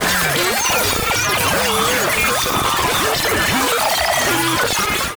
Sound effects > Electronic / Design
Optical Theremin 6 Osc Destroyed-006
Glitch, DIY, Theremin, Trippy, Instrument, Optical, Spacey, Experimental, Electronic, Sci-fi, Otherworldly, Infiltrator, Analog, Electro, Synth, Glitchy, Robotic, FX, Scifi, Bass, SFX, Dub, Noise, Alien, Robot, noisey, Sweep, Handmadeelectronic, Theremins, Digital